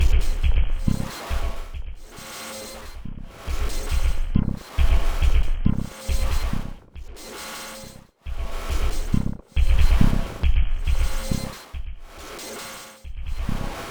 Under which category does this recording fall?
Instrument samples > Percussion